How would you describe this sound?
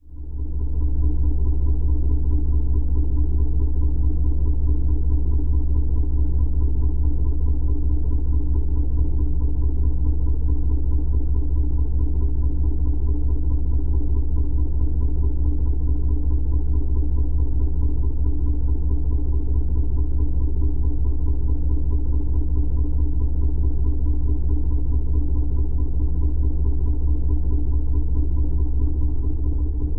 Sound effects > Vehicles
A LOM Geofon contact microphone mounted on the metal bodywork of a farm tractor while in motion. Recorded by a Tascam FR-AV2